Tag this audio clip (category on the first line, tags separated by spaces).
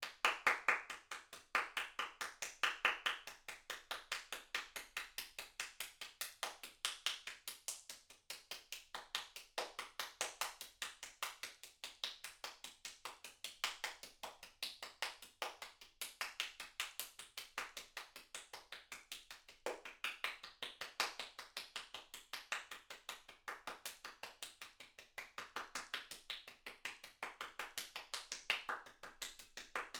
Sound effects > Human sounds and actions
person solo indoor NT5 clap AV2 XY individual Tascam Solo-crowd Applauding FR-AV2 Applaud clapping Applause Rode